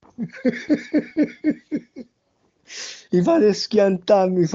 Speech > Solo speech
Manolo Laugh
My friend Manolo is laughing after hearing a joke from some friends, so funny :D. In the last part he said like "guys you make me laugh a lot", in Tuscan Italian slang ( mi fate schiantà mi fate). Recorded with my mobile phone
smile, happy, humor, laughing